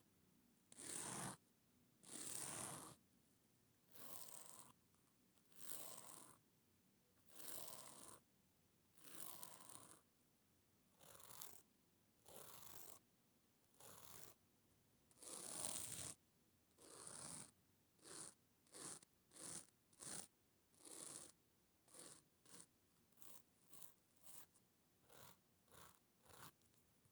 Sound effects > Experimental
OBJECTHousehold Sponge scratching fork dry crusty NMRV FSC2
scratching and making a crunchy sound on sponge fork spikey sound